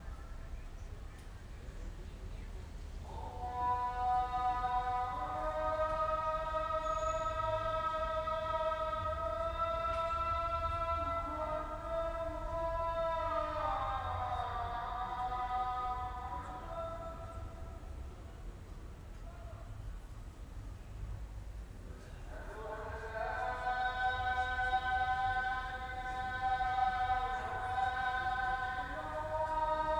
Soundscapes > Urban
Tirana City Ambience Muezzin rrugaKavajes
A muezzin in a quiet street nearby the Dine Hoxha Mosque in Tirana, december 2025, daytime, followed by a 1mn ambience. Recorded with 2 x EM272 Micbooster Omni microphones & Tascam FR-AV2
call-to-prayer, islam, tirana, muezzin, bektashi, field-recording, albania, muslim, prayer, mosque